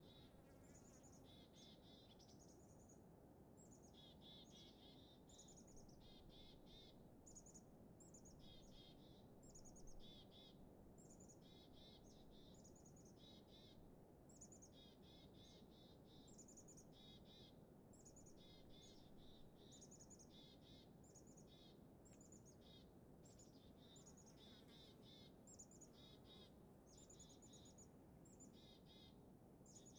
Soundscapes > Nature
AMBAlpn RaeLakes Cicadas, Birdsong, Distant Wind 02 ShaneVincent PCT25 20250708
General alpine lake ambience. Birds, cicadas, mountain wind This recording, along with the others in this pack, were taken during a 50-day backpacking trip along a 1000 mile section of the Pacific Crest Trail during the summer of 2025. Microphone: AKG 214 Microphone Configuration: Stereo AB Recording Device: Zoom F3 Field Recorder
afternoon, birds, cicadas, field-recording, wind